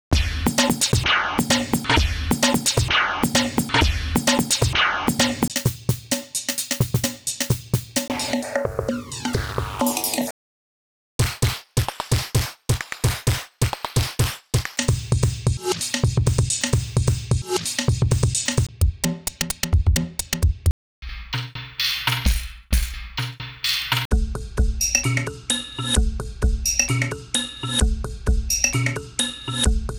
Music > Multiple instruments
Break Shapes (extended glitchy break beats to pull samples from)
An extended evolving loop of various break fx and beats, very glitchy, very processed, created in FL Studio using a myriad of vsts including Shaperbox, Infiltrator, Fabfilter, Freak, Raum, Trash, Rift, and others. Processed further in Reaper. I Imagine taking snippets and samples throughout this mix to create other actual listenable tracks, but even as chaos this one has some charm. Enjoy~